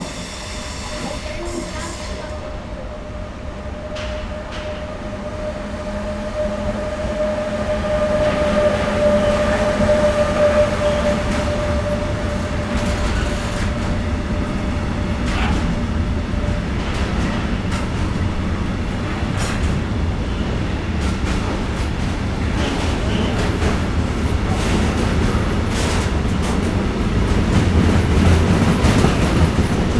Soundscapes > Urban
Train Passing by in the Train Station
Train passing by while at the platform of Padova railway station
announcement, platform, train, station, field-recording, railway, railway-station